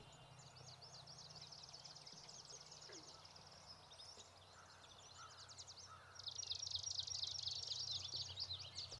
Soundscapes > Nature
Birds in Maple Grove
Tascam DR-60 RodeNTG3
birdsong, nature, field-recording